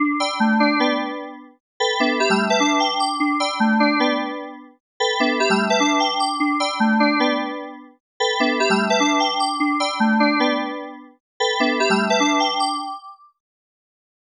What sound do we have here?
Music > Other
Frutiger Aero-Song
This music represents the childhood of many children and teenagers in the 2000s... You're probably already an adult, or close to being one, who remembers the "Frutiger Aero" as a pretty great time, and it is, because it marked many childhoods over the years and generations. And although the Frutiger Aero is no longer as relevant these days, it was revived in 2023-2025 And it continues to have a significant impact on communities and individuals who speak of the "Frutiger Aero" as its siblings (subcategories).